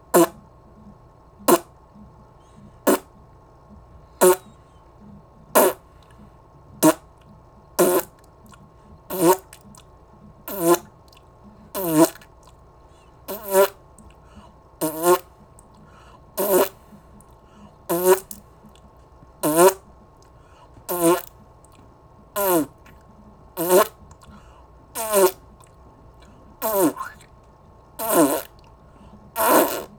Sound effects > Human sounds and actions
TOONVox-Blue Snowball Microphone, CU Spit, Bork, Zork Nicholas Judy TDC
A cartoonish spit, bork or zork.
Blue-brand, Blue-Snowball, bork, cartoon, spit, vocal, zork